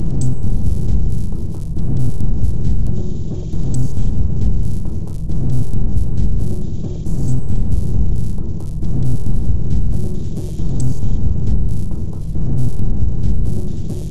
Soundscapes > Synthetic / Artificial
This 136bpm Ambient Loop is good for composing Industrial/Electronic/Ambient songs or using as soundtrack to a sci-fi/suspense/horror indie game or short film.
Loop, Samples, Dark, Soundtrack, Packs, Ambient, Drum, Underground, Loopable, Weird, Industrial, Alien